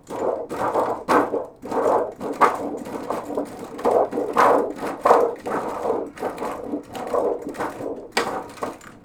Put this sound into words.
Sound effects > Objects / House appliances
A large plastic sheet warble.